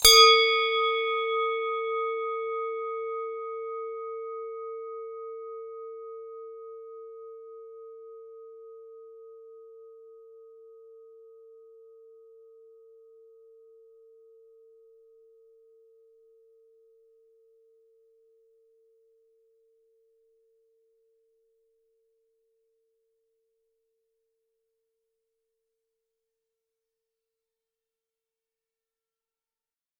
Instrument samples > Percussion
Subject : A cowbell (actual bell not the instrument) 14cm large by 11cm high. Date YMD : 2025 04 21 Location : Gergueil France. Hardware : Tascam FR-AV2 Rode NT5 microphones. Weather : Processing : Trimmed and Normalized in Audacity. Probably some Fade in/outs too.